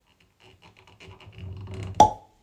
Soundscapes > Indoors
As you pull the cork, there’s a satisfying, resonant “pop” followed by a brief, woody echo—a promise of the peaty, smoky dram within.